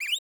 Sound effects > Animals
Mouse Rodent Squeak Whistle
Recording of a guinea pig making a very strange noise. Very light processing.
mouse, growl, rodent, animal, vocalization, whistle